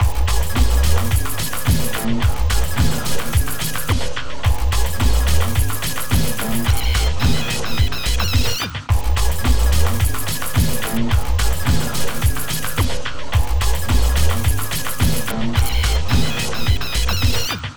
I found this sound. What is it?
Music > Multiple instruments
fly bungee beat
Downtempo dance beat with glitched sample and reverb. Features heavily modified samples from PreSonus loop pack included in Studio One 6 Artist Edition
dance, glitch